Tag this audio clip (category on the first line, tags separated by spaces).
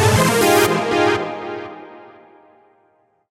Instrument samples > Synths / Electronic
future futuristic sci-fi strange